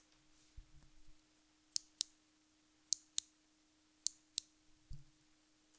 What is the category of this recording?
Soundscapes > Other